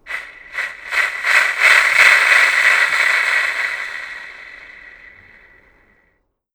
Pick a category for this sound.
Sound effects > Vehicles